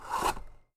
Sound effects > Objects / House appliances
PAPRHndl-Blue Snowball Microphone, CU Tissue Pull Out Nicholas Judy TDC
A tissue being pulled out.
Blue-brand, Blue-Snowball, foley, out, pull, tissue